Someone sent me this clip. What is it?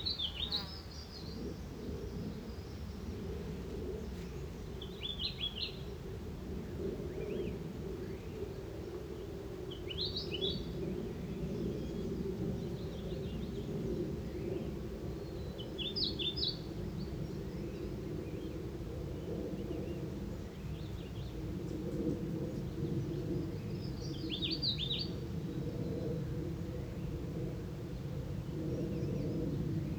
Soundscapes > Other
Morning ambience with birds, insects, gentle wind and plane. Recorded with iPhone 14 internal microphone in Sailung, Dolkha, Nepal.